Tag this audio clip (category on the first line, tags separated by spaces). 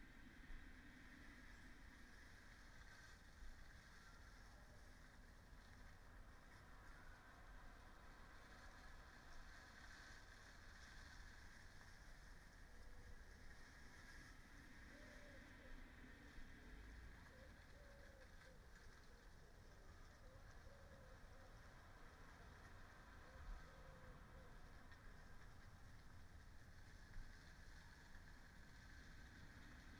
Soundscapes > Nature
field-recording data-to-sound modified-soundscape weather-data sound-installation nature Dendrophone artistic-intervention raspberry-pi phenological-recording alice-holt-forest natural-soundscape soundscape